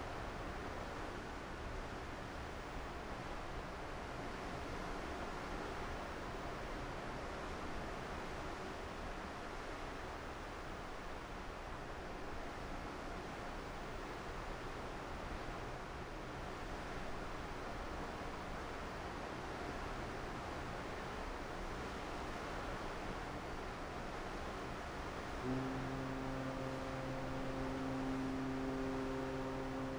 Soundscapes > Other
Multiple foghorns can be heard, all quite distant and with a bit of natural reverb. The recorder was placed near the side of the ship, so the wash can be heard clearly too.
fog, ship